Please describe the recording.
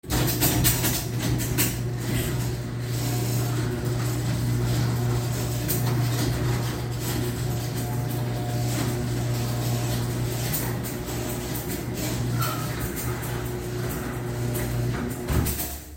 Sound effects > Other mechanisms, engines, machines
Garage Door (Closing)
My house's garage door closing for about 15.5 seconds.
garage, shutters, overhead, house, door